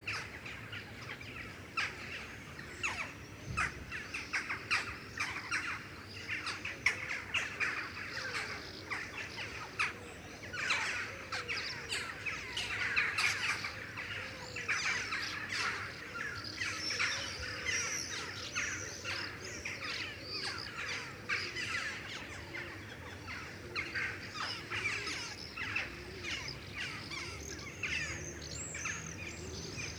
Soundscapes > Urban
jackdaws swift sumer evening at Rostrenen 1
Jackdaws, swifts, sparows pigeons and other birds at the evening in Rostrenen. Birds are flying aroud in a large place. Some cars and other anthropic sounds.
field-recording, birds, village, ker-breizh, Britany, evening, swift, city, Rostrenen, France, jackdaw, jackdaws, summer, Breizh, ambiance